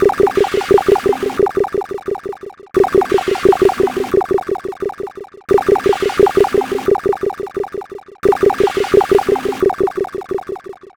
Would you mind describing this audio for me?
Instrument samples > Percussion
This 175bpm Drum Loop is good for composing Industrial/Electronic/Ambient songs or using as soundtrack to a sci-fi/suspense/horror indie game or short film.
Alien, Ambient, Dark, Drum, Industrial, Loop, Loopable, Packs, Samples, Soundtrack, Underground, Weird